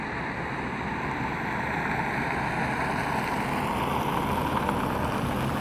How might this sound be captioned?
Soundscapes > Urban
voice 15 14-11-2025 car
Car, CarInTampere, vehicle